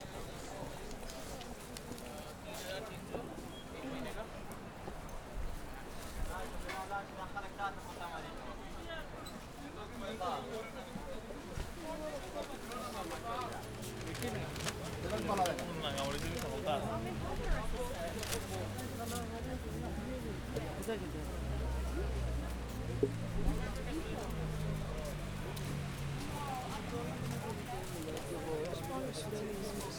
Soundscapes > Urban
Recordings from near a street market stall in a mostly Indian / Bangladeshi neighborhood of London. Quite noisy and chaotic. Unprocessed sound, captured with a Zoom H6